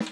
Music > Solo percussion
Snare Processed - Oneshot 131 - 14 by 6.5 inch Brass Ludwig
brass, ludwig, drumkit, crack, reverb, snare, hits, snareroll, beat, sfx, roll, snares, drums, flam, percussion, hit, realdrums, drum, fx, oneshot, rim, perc, realdrum, rimshot, snaredrum, acoustic, rimshots, processed, kit